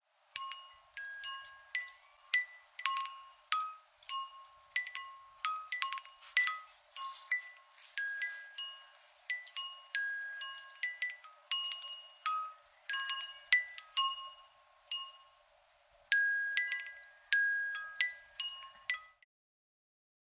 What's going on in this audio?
Sound effects > Other mechanisms, engines, machines
4 element wind chime attahced to a small tree in a light breeze located in a suburban backyard.